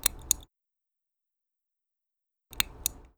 Sound effects > Objects / House appliances
CLOCKMech-Blue Snowball Microphone, CU Stopwatch, Start, Stop, No Ticks Nicholas Judy TDC
A stopwatch starting and stopping without ticks.
start, stopwatch, Blue-brand, foley, stop, Blue-Snowball